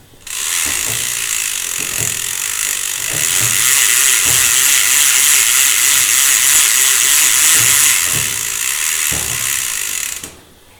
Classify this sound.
Sound effects > Other mechanisms, engines, machines